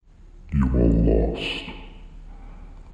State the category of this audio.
Speech > Solo speech